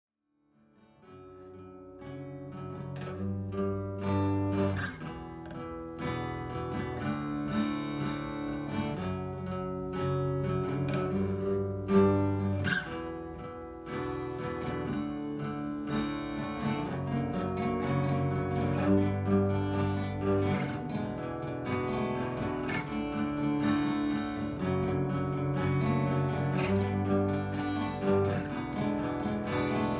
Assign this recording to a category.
Music > Solo instrument